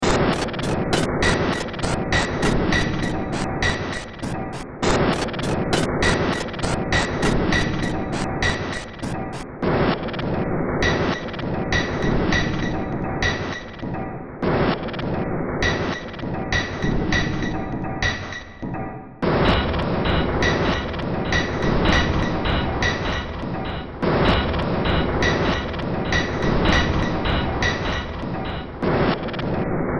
Music > Multiple instruments
Demo Track #3797 (Industraumatic)
Underground, Noise, Soundtrack, Horror, Games, Ambient, Sci-fi, Cyberpunk, Industrial